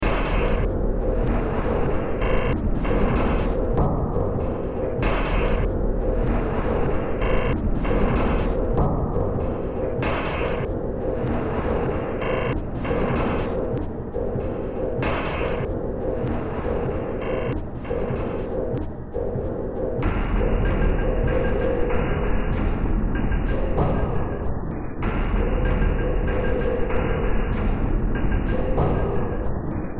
Music > Multiple instruments
Demo Track #3259 (Industraumatic)
Ambient, Cyberpunk, Games, Horror, Industrial, Noise, Sci-fi, Soundtrack, Underground